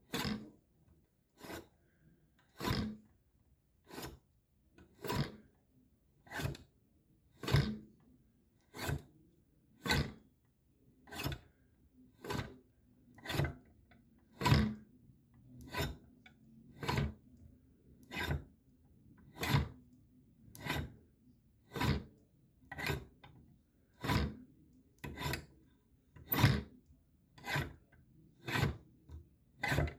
Sound effects > Objects / House appliances
A drain plug pulling and pushing.